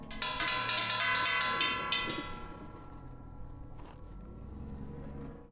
Sound effects > Objects / House appliances
Junkyard Foley and FX Percs (Metal, Clanks, Scrapes, Bangs, Scrap, and Machines) 122
SFX, trash, dumping, Percussion, Perc, Clank, Smash, waste, Environment, Dump, rattle, garbage, Metallic, Junk, rubbish, Junkyard, Bash, scrape, dumpster, Robotic, Robot, Clang, Atmosphere, FX, Foley, Bang, Ambience, Machine, tube, Metal